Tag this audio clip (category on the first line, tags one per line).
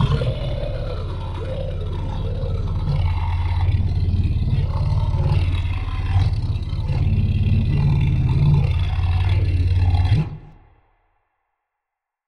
Sound effects > Experimental
Fantasy
Frightening
fx
gamedesign
Groan
gutteral
Monstrous
Otherworldly
Reverberating
sfx